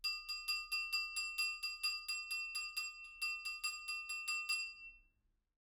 Sound effects > Other
Glass applause 13
A series of me recording multiple takes in a medium sized bedroom to fake a crowd. Clapping/talking and more original applause types, at different positions in the room. This glass applause series was done with different glasses and coffee spoons. With hindsight, I should have done some with water in them... Recorded with a Rode NT5 XY pair (next to the wall) and a Tascam FR-AV2. Kind of cringe by itself and unprocessed. But with multiple takes mixed it can fake a crowd. You will find most of the takes in the pack.
applause, cling, clinging, FR-AV2, glass, individual, indoor, NT5, person, Rode, single, solo-crowd, stemware, Tascam, wine-glass, XY